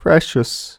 Speech > Solo speech
Affectionate Reactions - Precious
dialogue, Male, Vocal, NPC, Man, Video-game, Human, FR-AV2, U67, voice, Mid-20s, Tascam, Single-take, oneshot, affectionate, Neumann, reaction, word, Voice-acting, singletake, talk